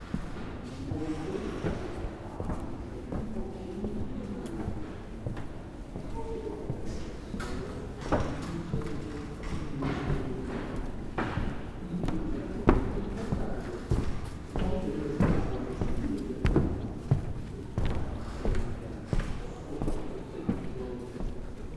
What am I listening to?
Soundscapes > Indoors
Steps on the Stairs - Biennale Exhibition Venice 2025
The sound of steps on the stairs. Sound recorded while visiting Biennale Exhibition in Venice in 2025 Audio Recorder: Zoom H1essential